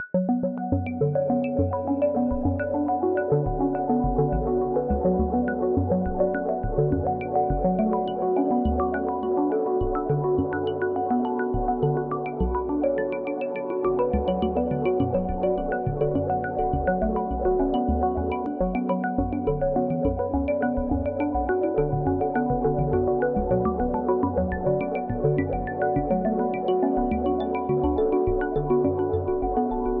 Music > Solo instrument
Mycelium Pluck Synth Loop 104bpm B Minor
Chill, Pluck, FREE, melody-loop, Sine-Bell, Chillout-Loop, Pluck-Synth, B-Minor, Ambient, Melodic, plucky-boii